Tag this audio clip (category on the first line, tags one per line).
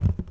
Sound effects > Objects / House appliances
foley
garden
hollow
lid
metal
pail
scoop
spill
tip
tool
water